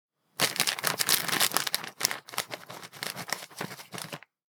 Sound effects > Other
FOODEat Cinematis RandomFoleyVol2 CrunchyBites Food.Bag TakeSeveralTortillaChipsOut Freebie
chips, crunchy, crunch, bites, SFX, food, postproduction, texture, bag, foley, sound, bite, recording, handling, plastic, tortilla, design, effects, rustle